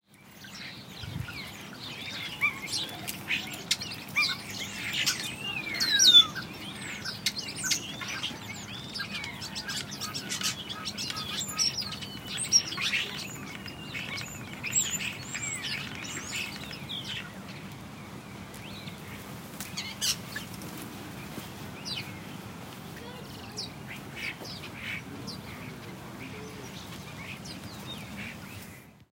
Soundscapes > Nature
Moorland Park, Cardiff - Birds Chatter and Sing
Birds chatter and sing in the brambles in Moorland Park, Splott, Cardiff. January.